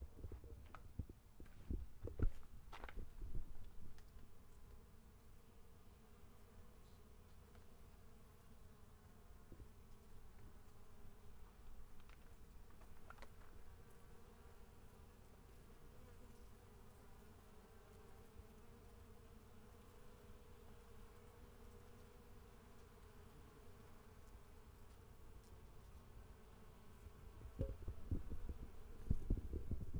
Sound effects > Natural elements and explosions
A swarm of wasps in a bush in Jamaica. Recorded on ZoomH5, the recording contains some handling noise which will need to be edited out.
bee, beehive, bees, buzz, buzzing, hive, insect, insects, swarm